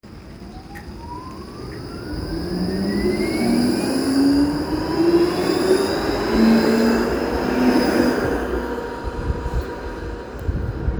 Sound effects > Vehicles
A tram accelerated from stop position on an almost-winter day. The sound was recorded in Hervanta, Tampere, using the built-in microphone of the Samsung Galaxy S21 FE. No special grea was used besides that; the recorder just simply tried his best to prevent noise. The sound was recorded to be used as a sample for a binary audio classification project.